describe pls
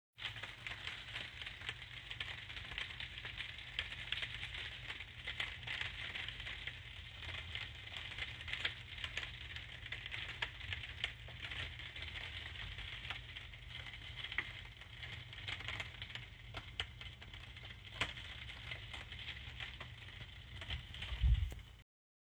Sound effects > Natural elements and explosions
Rain on a Plastic Roof
The sounds of rain falling on a plastic roof. Recorded 27th August in Somerset, England. Recorded with a Google Pixel 9a phone. I want to share them with you here.